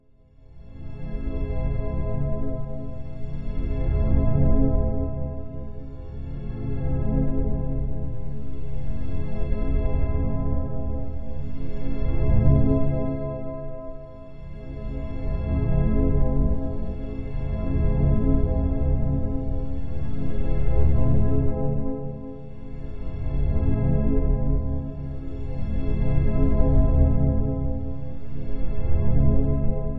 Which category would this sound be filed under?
Sound effects > Electronic / Design